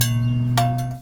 Sound effects > Objects / House appliances
Junkyard Foley and FX Percs (Metal, Clanks, Scrapes, Bangs, Scrap, and Machines) 15
tube, rattle, Junk, Bang, Perc, Dump, Robotic, Ambience, SFX, rubbish, Metallic, Foley, trash, Clang, Machine, Environment, scrape, Smash, Junkyard, Robot, Bash, Percussion, dumping, dumpster, garbage, FX, Metal, Clank, Atmosphere, waste